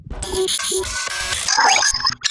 Sound effects > Experimental
Gritch Glitch snippets FX PERKZ-019
percussion, glitchy, snap, clap, experimental, impact, lazer